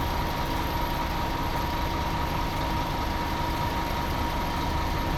Sound effects > Vehicles
A bus idling in Tampere, Finland. Recorded with OnePlus Nord 4.
bus, vehicle